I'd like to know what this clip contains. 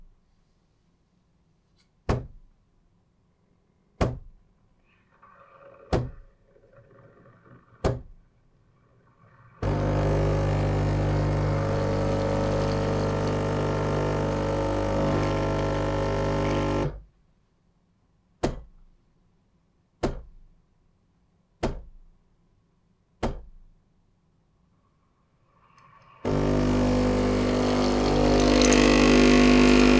Soundscapes > Other
Bosch Tassimo coffee machine
I recorded the sound of my Tassimo coffee machine. The entire brewing process is recorded — from start to the finished cup of coffee. Recorded with a Zoom H1n and a Movo X1-Mini microphone mounted on a mini tripod.
coffee, coffee-machine, H1n, kitchen, MovoX1-mini, tassimo